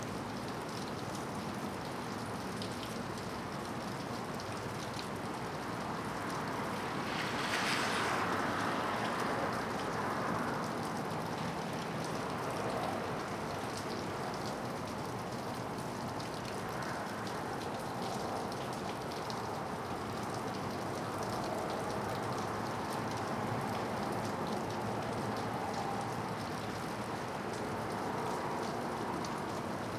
Soundscapes > Urban
In the middle of the night, it's raining and there is light traffic. Very normal in my neighborhood.
cars, soundscape, drip, traffic, night, rain, relaxing, ambience, city, urban, street, wet, field-recording